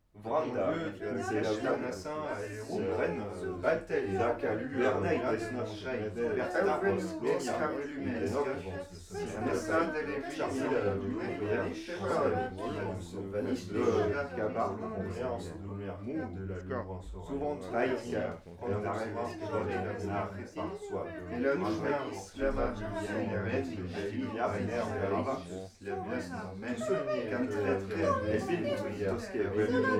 Speech > Conversation / Crowd
Mumbo Jumbo bus 2
A series of me recording multiple takes in a medium sized bedroom to fake a crowd. Clapping/talking and more original applause types, at different positions in the room. Here trying to fake a crowd, with near 10 takes of me saying nonsense noise. It's a little hard and cringe to make different characters but hey I tried. Recorded with a Rode NT5 XY pair (next to the wall) and a Tascam FR-AV2. You will find most of the takes in the pack.
crowd, FR-AV2, indoor, mixed, mumbling, noise, NT5, processed, Rode, solo-crowd, Tascam, XY